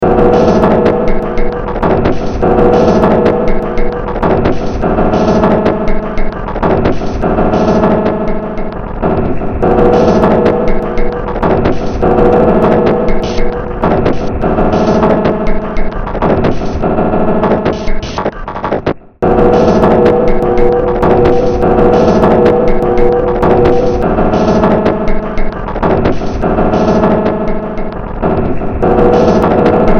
Music > Multiple instruments
Short Track #3465 (Industraumatic)
Soundtrack, Games, Industrial, Noise, Cyberpunk, Horror, Sci-fi, Underground, Ambient